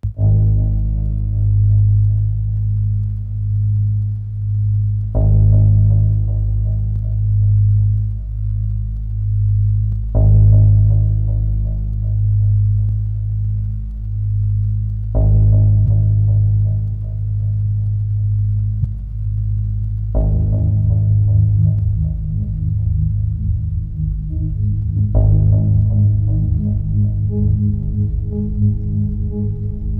Music > Multiple instruments
Ambient Low Frequency Granular Soundscape Texture #001
I recorded some samples with my guitar and used Torso S4 to create a slightly evolving dark ambient background with low-frequency tones
ambient, evolving, experimental, pad, soundscape, texture